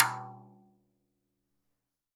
Music > Solo instrument
Rim Hit Perc Oneshot-004
GONG, Metal, Custom, Perc, Cymbal, Drum, Paiste, Ride, Kit, Percussion, Hat, Cymbals, Oneshot, Drums, Crash, FX, Sabian